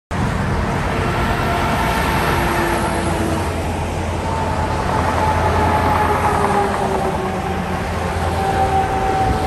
Sound effects > Vehicles
Sun Dec 21 2025 (11)
Truck passing by in highway